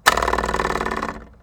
Sound effects > Objects / House appliances
TOONTwang-Blue Snowball Microphone, CU Metronome, Flick Nicholas Judy TDC
A metronome flick twang.